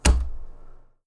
Sound effects > Objects / House appliances
GAMEMisc-Blue Snowball Microphone Card, Hit on Desk Nicholas Judy TDC
A card being slammed on a desk
Blue-Snowball, desk, foley, slam